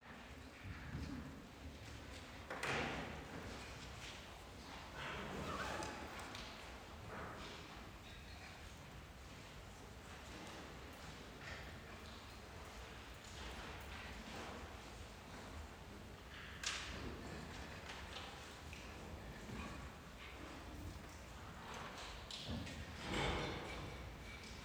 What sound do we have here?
Soundscapes > Indoors
Dipòsit de les Aigües- Universitat Pompeu Fabra Barcelona
This sound features the mix of quiet noises in the Dipòsit de les Aigües library in Barcelona.